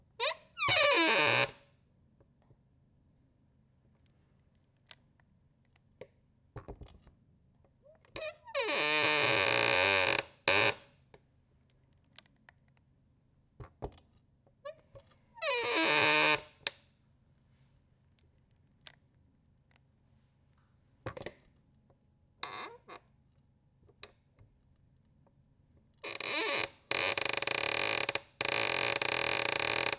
Sound effects > Objects / House appliances
Squeaky chair 2 - Dji-mic3
Subject : My squeaky office chair before applying some WD-40 (it fixed it a charm). Date YMD : 2025 October 21 Location : Indoor. Hardware : Dji-Mic3 internal recording. Weather : Processing : Trimmed and normalised in Audacity.
squeeky, grincing, mic3, metal, Dji-Mic3, chair, squeak, Omni, office-chair, Dji, squeaky